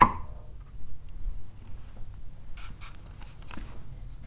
Sound effects > Other mechanisms, engines, machines
Woodshop Foley-045
shop, wood, rustle, foley, pop, thud, boom, metal, sfx, strike, oneshot, tools, knock, little, bang, bop, bam, percussion, fx, tink, perc, crackle, sound